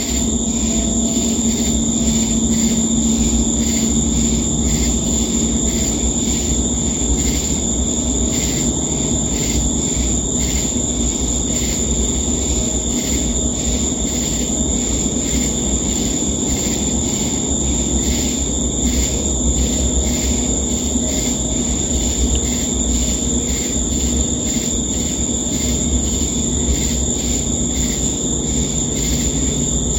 Soundscapes > Nature
Night noises with cicadas, crickets and shakers.